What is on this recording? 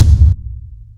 Instrument samples > Percussion
floortom 1 bang start 1c long
A wet main floortom 1.
Pearl, 16x16, Yamaha, tom, Gretsch, bougarabou, deeptom, djembe, tom-tom, Sakae, strike, Ludwig, Mapex, floortom, bongo, DW, dundun, bass, hit, floor, bata, deepbass, Premier, basstom, ashiko, Tama, Sonor, PDP, drums, drum